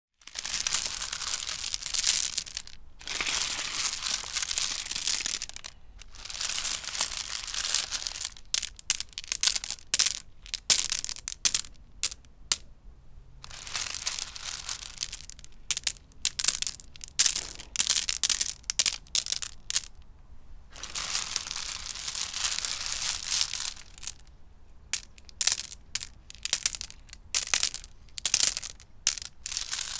Sound effects > Natural elements and explosions
ROCKMvmt Handling smooth garden pebbles
Picking up smooth garden pebbles, dropping them onto a large cement paver then dragging/scraping them off by hand.
rubble, scraping